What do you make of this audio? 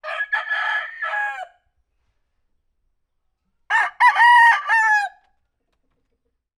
Sound effects > Animals
250820 104023 PH Roosters
Roosters. (Take 2) Recorded in the surroundings of Santa Rosa (Baco, Oriental Mindoro, Philippines)during August 2025, with a Zoom H5studio (built-in XY microphones). Fade in/out applied in Audacity.